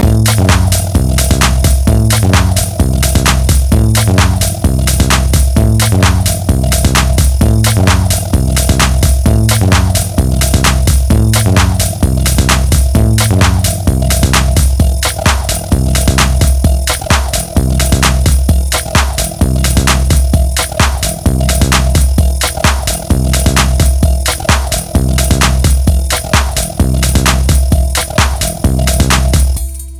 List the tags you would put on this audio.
Music > Multiple instruments
130 ACID BEAT bpm JUNGLE LOOP

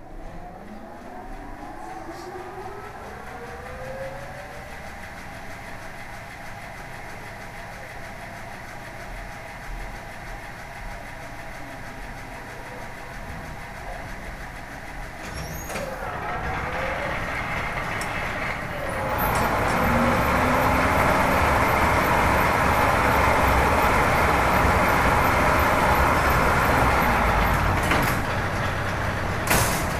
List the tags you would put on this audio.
Sound effects > Other mechanisms, engines, machines
clanking cotton cotton-mill edirol-r-09 factory field-recording historic industrial machine machinery manchester mechanical mills noise rhythmic spinning Spinning-mule textile victorian weaving whirring

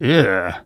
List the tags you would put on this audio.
Sound effects > Human sounds and actions
emotion painful stepping-in-dog-poop screaming interjection yuck shouting eww pain emotional disgusting scream yell voice hurt vocal male man disgust masculine

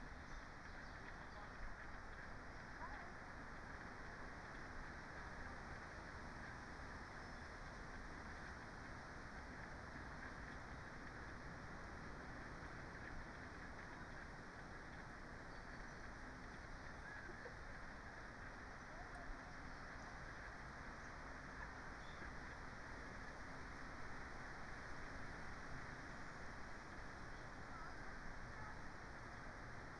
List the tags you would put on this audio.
Soundscapes > Nature
raspberry-pi weather-data Dendrophone soundscape field-recording artistic-intervention natural-soundscape modified-soundscape alice-holt-forest sound-installation nature data-to-sound phenological-recording

